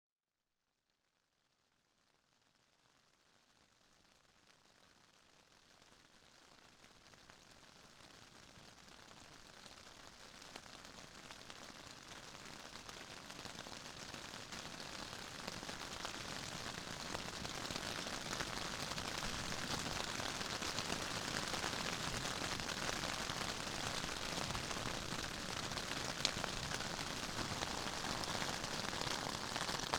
Soundscapes > Nature
Desolation Wilderness - Rain and Thunder - In Tent
Rain and thunder while backpacking in Desolation Wilderness, near Lake Tahoe. This is recorded from underneath our tent, around midnight.
Rain, Thunderstorm, Wilderness, Storm, Weather, Lightning, Thunder